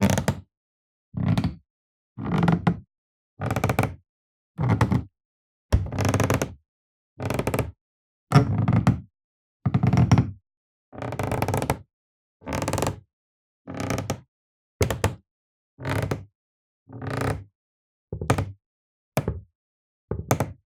Sound effects > Objects / House appliances
Foley Creak Wood Floor Sequence Stereo
Floor Creak (Wood, Old) - Sequence. You can edit it with Reaper/Audacity/etc. Gear: Sony PCM D100.
creak, vintage, interior, squeaky, wood, house, woodcreak, steps, floor, squeaking, footsteps, wooden, rustic, squeak, floorboard, old, creaking